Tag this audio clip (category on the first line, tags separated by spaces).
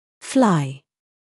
Speech > Solo speech
pronunciation voice english